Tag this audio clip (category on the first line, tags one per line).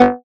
Instrument samples > Synths / Electronic
bass
additive-synthesis
fm-synthesis